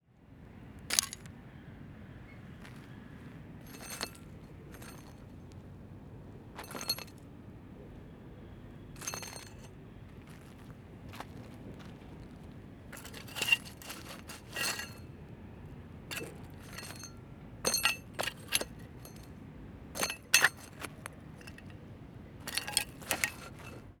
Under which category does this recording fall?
Sound effects > Other